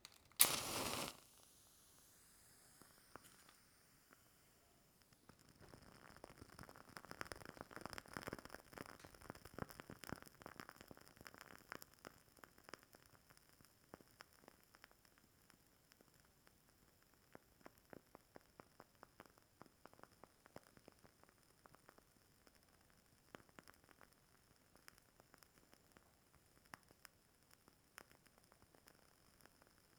Sound effects > Other
Candle. Fire. Match
Burning candle Recorded that sound by myself with Recorder - H1 Essential
Fire, Match, Candle